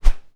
Sound effects > Objects / House appliances
Whoosh - Plastic Hanger 2 (Outer clip) 3
Airy, coat-hanger, Fast, FR-AV2, Hanger, NT5, Plastic, Rode, SFX, swing, swinging, Tascam, Transition, Whoosh